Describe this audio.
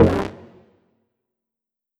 Sound effects > Electronic / Design
LoFi Grunt-03
Lofi, sudden and short grunt. Retro-esque sound emulation using wavetables.
animal, grunt, lofi, belch, retro, synth, monster